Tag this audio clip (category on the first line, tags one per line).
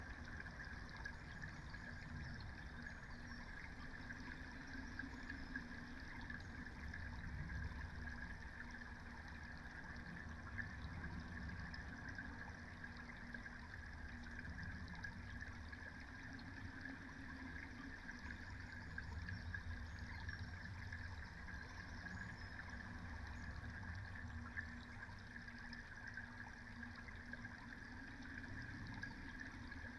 Soundscapes > Nature
natural-soundscape
data-to-sound
raspberry-pi
weather-data
field-recording
soundscape
Dendrophone
modified-soundscape
sound-installation
alice-holt-forest
nature
phenological-recording
artistic-intervention